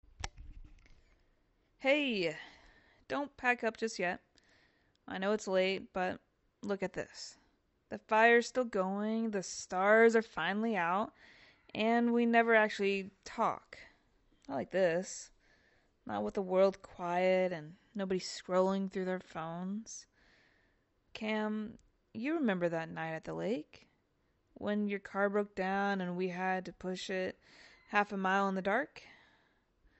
Speech > Solo speech
“Stay a Little Longer” (emotional / nostalgic / found family vibes)
A heartfelt, campfire-style moment between close friends. Perfect for cozy narration, emotional storytelling, or comfort-driven audio projects. Script: Hey. Don’t pack up just yet. I know it’s late, but… look at this. The fire’s still going. The stars are finally out. And we never actually talk, not like this—not with the world quiet and nobody scrolling through their phones. Cam, you remember that night at the lake? When your car broke down and we had to push it half a mile in the dark? Or Eli—when you tried to cook pancakes over the camp stove and nearly set your eyebrows on fire? We’ve had some weird years, huh? But you’re still here. We all are. And I don’t know—maybe this is one of those nights we’ll remember when we’re older. When the hair’s a little greyer and our knees crack when we stand. So… stay a little longer. Just one more story. One more song. We’ll clean up in the morning. Tonight? Let’s just be here.
warmnarration, script, ministory